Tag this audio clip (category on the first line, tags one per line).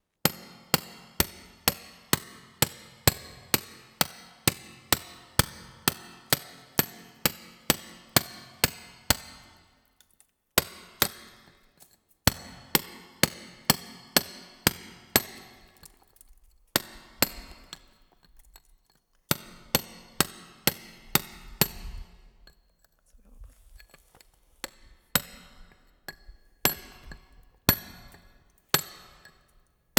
Sound effects > Human sounds and actions
cave
quarry
mining
chisel